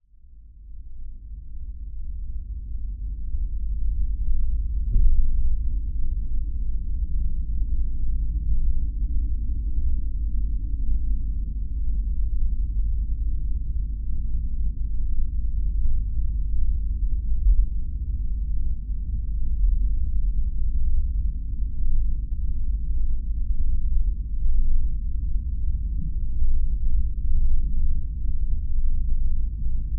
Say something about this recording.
Sound effects > Electronic / Design
fx drone window kengwai cct
LOM Geofon mounted on a window pane, recorded with a Tascam FR-AV2. Equalization applied in post-production.
ambient, bass, drone, fx, geofon